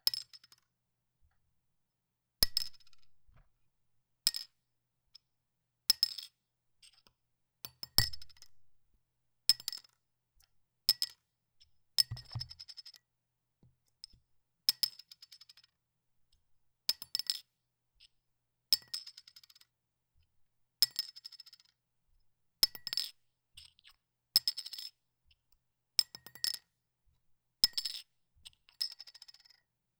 Sound effects > Objects / House appliances
OBJMisc-Blue Snowball Microphone, MCU Bottle Cap, Drop Nicholas Judy TDC
A bottle cap dropping.
bottle, Blue-Snowball, cap, drop, foley, bottle-cap, Blue-brand